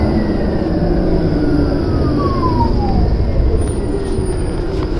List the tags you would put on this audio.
Sound effects > Vehicles
tramway
transportation
vehicle

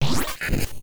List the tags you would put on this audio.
Sound effects > Electronic / Design
digital
Glitch
hard
one-shot
pitched
stutter

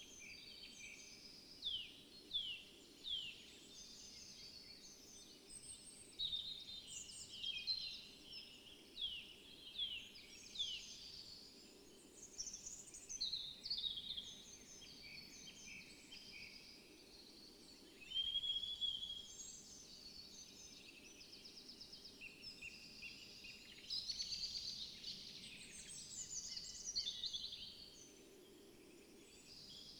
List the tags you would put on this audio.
Soundscapes > Nature
artistic-intervention
alice-holt-forest
natural-soundscape
field-recording
nature
weather-data
sound-installation
phenological-recording
Dendrophone
soundscape
raspberry-pi
modified-soundscape
data-to-sound

